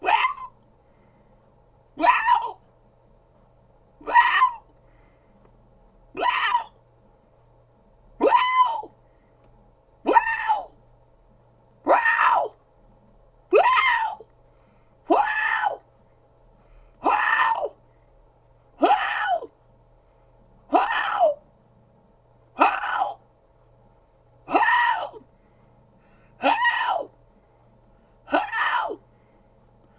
Sound effects > Animals
TOONAnml-Blue Snowball Microphone, MCU Fox, Howl, Human Imitation Nicholas Judy TDC
A fox howl. Human imitation. Sounds almost realistic.